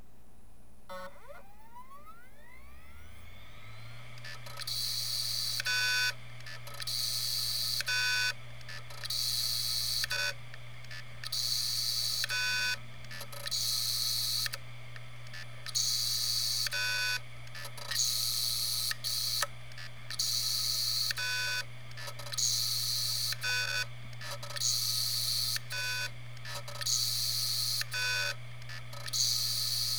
Sound effects > Electronic / Design
Hard Disk Drive Mechanical Failure
Due to a malfunctioning component in a HDD (HGST 0F10381) bought from Ebay, it was doing mechanical beep and nice chirp sounds. Recorded with Tascam DR-05X, directly attached to the closed hard disk.
hard-disk-drive, chirp, technology, error